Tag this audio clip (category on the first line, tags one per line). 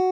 Instrument samples > String
arpeggio
cheap
design
guitar
sound
stratocaster
tone